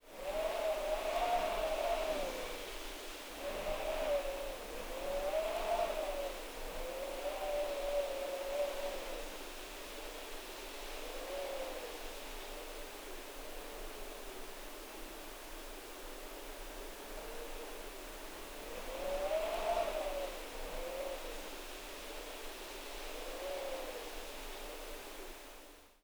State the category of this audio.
Soundscapes > Nature